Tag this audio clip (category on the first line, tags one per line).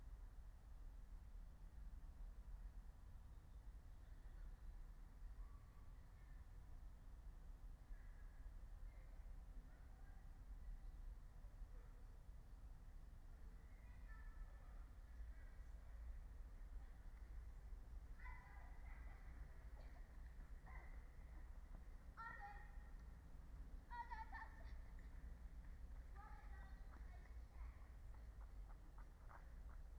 Soundscapes > Nature
meadow
nature
soundscape
raspberry-pi
natural-soundscape
phenological-recording
field-recording
alice-holt-forest